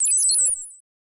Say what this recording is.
Sound effects > Electronic / Design
ELECTRIC SYNTHETIC CLICKY TONE
BEEP, BOOP, CHIPPY, CIRCUIT, COMPUTER, DING, ELECTRONIC, EXPERIMENTAL, HARSH, HIT, INNOVATIVE, OBSCURE, SHARP, SYNTHETIC, UNIQUE